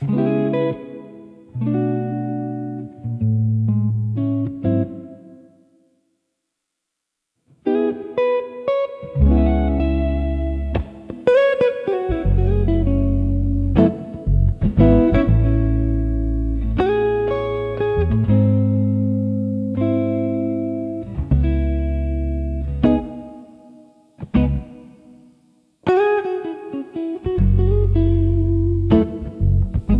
Music > Solo instrument
Archtop Jazz Guitar Solo - Emotional, Chords and Solo Notes #001
This is a jazz guitar solo generated with AI. This jazz guitar solo is made of amix of chords and single notes, slow tempo. The style would like to resemble the one of Joe Pass. Guitar is a archtop guitar, with its classic warm sound. No effects are used. AI Software: Suno Prompt used: Jazz guitar made of a mix of chords and single notes, slow tempo. Style: Joe Pass style. Instruments: Guitar only, arch top guitar, warm sound, no drums, no bass, no reverb and no effects. Mood: emotional